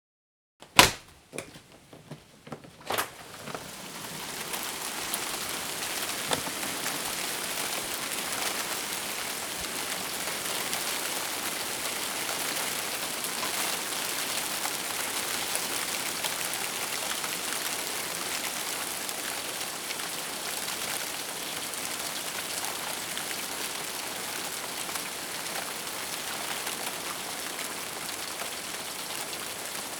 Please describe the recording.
Soundscapes > Nature
Hail turning to rain in Riverdale
A sudden hailstorm tapers off into heavy rain on an asphalt-shingled roof on the first storey of a house. You can also hear a metal downspout collecting water from a second storey above. The sound of the window being opened is audible at the start. Recorded on a Zoom H2n in 90-degree stereo mode from a window at the same level as the roof. Location is the Riverdale neighbourhood of Whitehorse, Yukon.